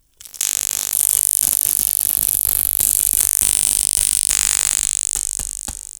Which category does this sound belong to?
Sound effects > Experimental